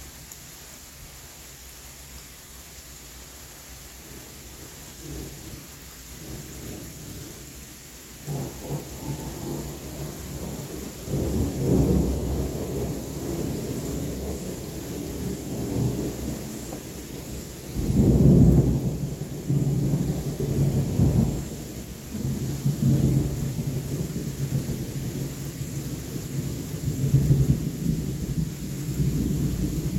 Soundscapes > Nature
STORM-Samsung Galaxy Smartphone, CU Thunderstorm, Lightning, Light to Heavy Rain Nicholas Judy TDC
Thunderstorm and lightning with light to heavy rain.
heavy, lightning, Phone-recording, rain, thunder, thunderstorm